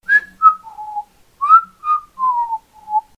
Sound effects > Human sounds and actions
A human whistling a short, silly tune.